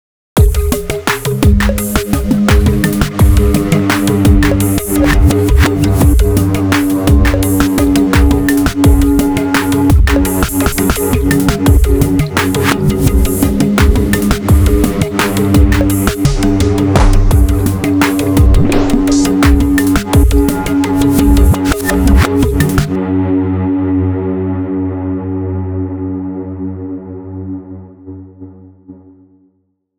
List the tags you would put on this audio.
Music > Multiple instruments
beats
percussion

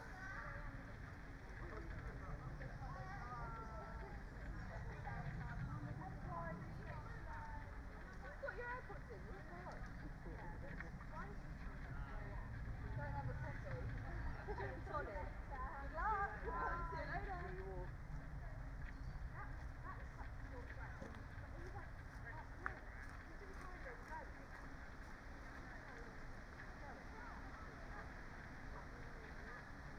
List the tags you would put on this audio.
Soundscapes > Nature
modified-soundscape
sound-installation
field-recording
nature
weather-data
natural-soundscape
Dendrophone
data-to-sound
raspberry-pi
alice-holt-forest
artistic-intervention
soundscape
phenological-recording

